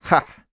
Speech > Solo speech
Cocky - HAh
Mid-20s, U67, sarcastic, oneshot, Single-take, voice, Man, Human, NPC, cocky, talk, Video-game, smug, FR-AV2, Tascam, singletake, Male, Vocal, Voice-acting, Neumann, dialogue, sound